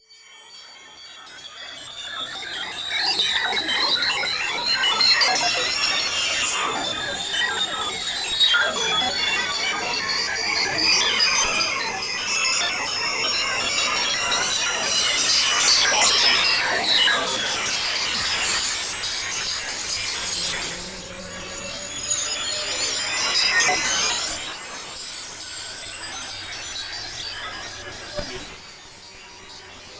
Sound effects > Electronic / Design
Sharp Tinglings 4
noise-ambient, ambient, noise, abstract